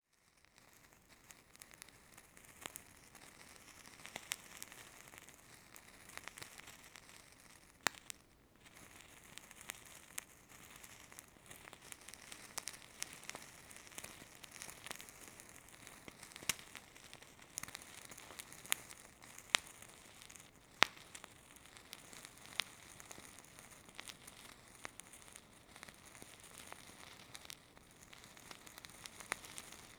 Sound effects > Objects / House appliances
Lighting insence burner charcoal
Lighting up the charcoal for an incense burner ceremony.
burner, burning, ceremony, charcoal, crackling, fire, insence, lighting